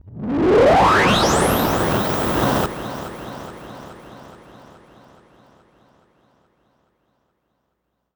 Sound effects > Experimental
Analog Bass, Sweeps, and FX-073
bass, bassy, sweep, synth